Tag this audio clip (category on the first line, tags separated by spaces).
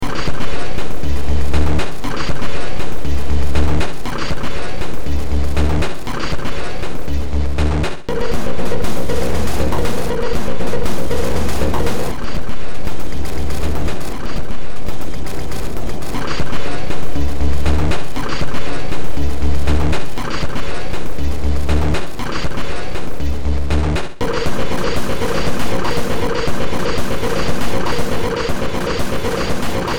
Music > Multiple instruments
Cyberpunk Games Horror Noise Soundtrack